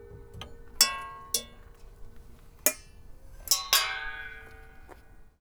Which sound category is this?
Sound effects > Objects / House appliances